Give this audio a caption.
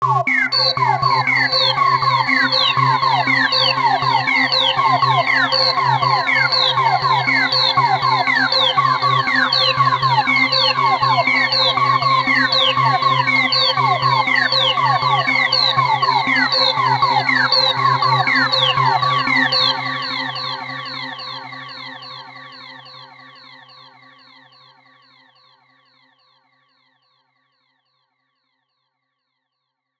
Sound effects > Electronic / Design
Aliens talking to each other Created with SynthMaster for iPad
talking, galaxy, alien